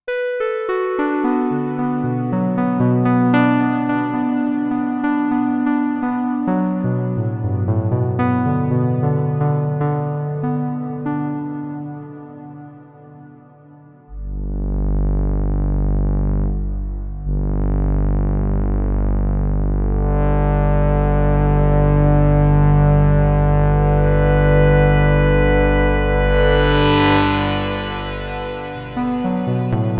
Music > Solo instrument
Soma Terra Ambient and Meditation #004
This is a recording which I did with the Soma Terra. Outside is raining and the autumn is coming. It’s becoming a bit chilly, but I am inside. It’s warm and the silence of the evening is melting around me. Recorder: Tascam Portacapture x6.
Ambient, Dreamscape, meditative, Soma, soma-terra, terra